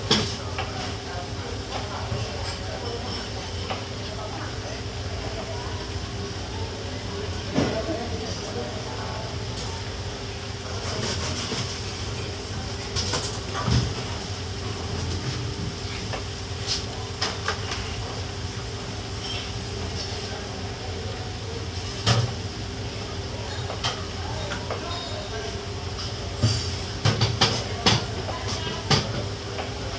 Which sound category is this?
Soundscapes > Indoors